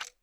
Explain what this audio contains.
Sound effects > Other mechanisms, engines, machines
clock tickA2
single tick, isolated Works best in tandem with the paired sound (ie: clock_tickA1 and clock_tickA2) for the back and forth swing.
clacking, clock, hand, minute, second, seconds, ticking, ticks, tick-tock, time